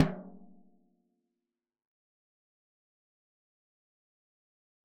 Music > Solo percussion
Floor Tom Oneshot -013 - 16 by 16 inch
floortom recording made in the campus recording studio of Calpoly Humboldt. Recorded with a Beta58 as well as SM57 in Logic and mixed and lightly processed in Reaper